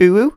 Speech > Solo speech
Other - UwU 1
talk, FR-AV2, Vocal, dialogue, Neumann, Kawaii, Voice-acting, U67, Single-take, UwU, NPC, Male, Mid-20s, Cute, singletake, oneshot, Human, Video-game, Tascam, Man